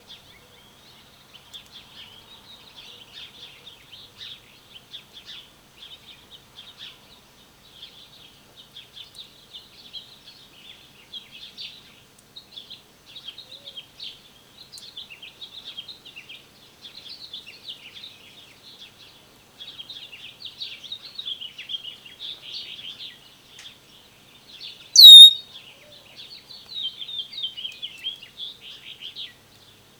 Soundscapes > Nature
Birds Feeding 1
Birds flying to and from feeder, chirping, wings flapping, insects buzzing. Distant propeller aircraft during last 2 to 3 minutes. Zoom H4N Pro, Neewer CM28 microphones. #6:40 bubbling from nearby fountain #8:35 distant propeller aircraft starts
bird, birds, birdsong, blackbird, chripping, doves, feeding, field-recording, flapping, nature, wings